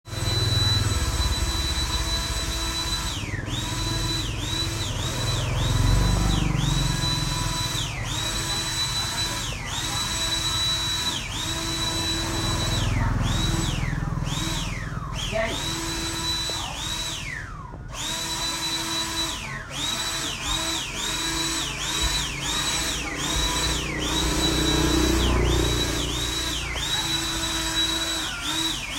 Sound effects > Other mechanisms, engines, machines
Máy Thỏi Không Khí - Air Blow Machine 1
Air blow machine for clean house. iPhone 7 Plus 2026.01.20 16:34